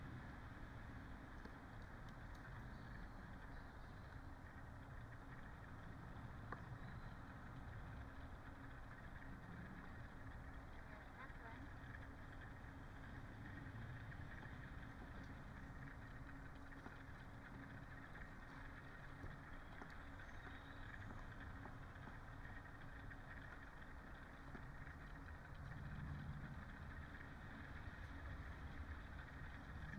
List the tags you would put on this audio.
Soundscapes > Nature
artistic-intervention
Dendrophone
natural-soundscape
nature
raspberry-pi
soundscape

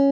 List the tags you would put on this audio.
Instrument samples > String
tone
sound
guitar
design
stratocaster
cheap
arpeggio

